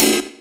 Instrument samples > Percussion
rockride 1 abbreviated
metal-cup, bellride, Hammerax, Mehmet, ridebell, Crescent, click-crash, bassbell, bell, Stagg, Paiste, Agean, cupride, ping, cymbell, Soultone, ride, Meinl, Istanbul-Agop, Zildjian, Diril, Istanbul, crashcup, cup, Amedia, Sabian, cymbal, bellcup, Bosphorus